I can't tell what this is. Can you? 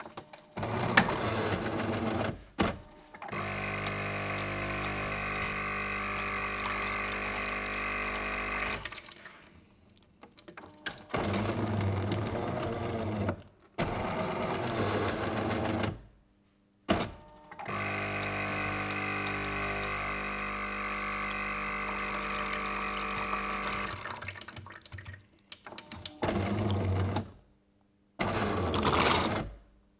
Sound effects > Other mechanisms, engines, machines
coffee machine
CoffeMachine and some coffe from machninecoffe Recorded that sound by myself with Recorder H1 Essential / in office